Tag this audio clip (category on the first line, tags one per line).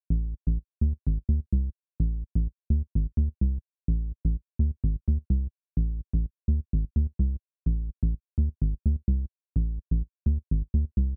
Music > Solo instrument
303
Acid
electronic
hardware
house
Recording
Roland
synth
TB-03
techno